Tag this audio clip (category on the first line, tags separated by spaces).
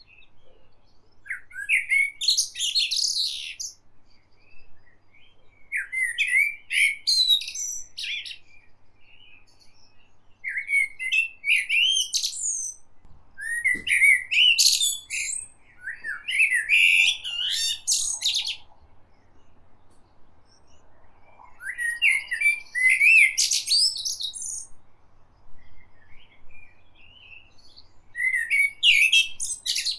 Sound effects > Animals
bird brids Common Myna song